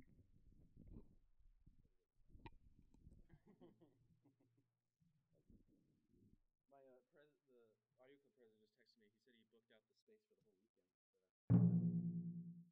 Music > Solo percussion
Med-low Tom - Oneshot 71 12 inch Sonor Force 3007 Maple Rack
drum, roll, drums, Tom, realdrum